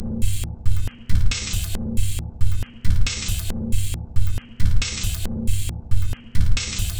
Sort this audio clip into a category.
Instrument samples > Percussion